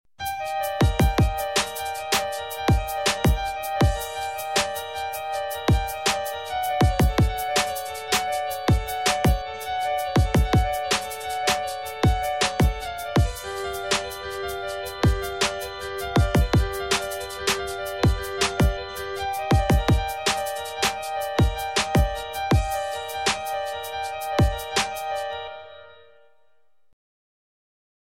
Music > Multiple instruments
Music song track with beats .